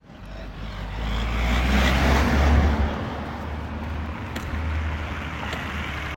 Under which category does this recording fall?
Soundscapes > Urban